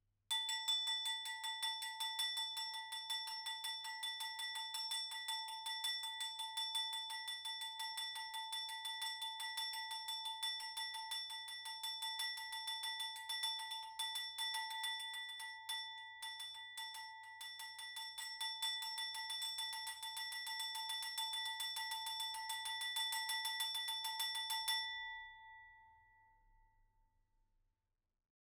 Sound effects > Other
Glass applause 24
applause, cling, clinging, FR-AV2, glass, individual, indoor, NT5, person, Rode, single, solo-crowd, stemware, Tascam, wine-glass, XY